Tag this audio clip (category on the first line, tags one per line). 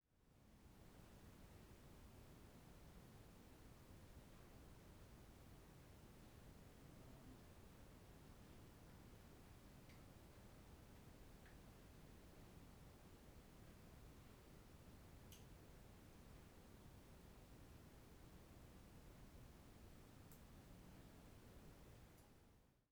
Soundscapes > Nature
stalactites
underground